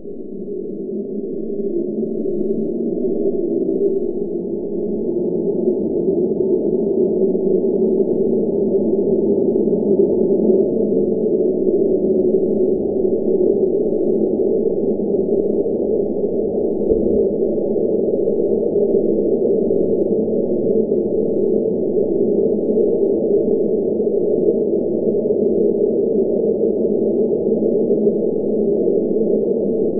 Sound effects > Experimental
horror sounds ambient
Scary sounds, Recorded on Rode NT1-A, its actually very slowed down from sounds what i made with my mouth
ambient, horror